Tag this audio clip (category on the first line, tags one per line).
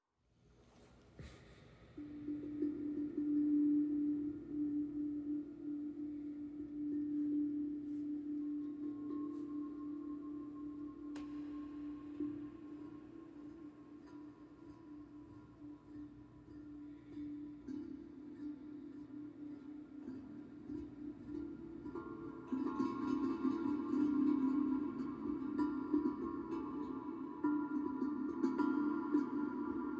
Music > Solo percussion
Calm
Chill
Handpan
LeafMusic
Loop
Relaxing
Sample